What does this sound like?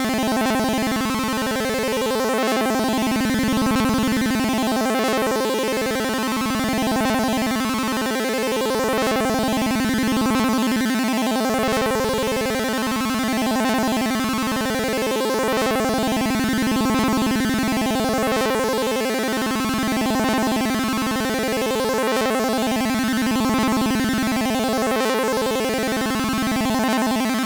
Sound effects > Electronic / Design
Clip sound loops 1
8-bit, clip, fx, game